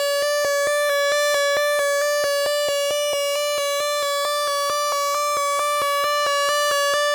Sound effects > Electronic / Design
Clip sound loops 5
clip, fx, game, 8-bit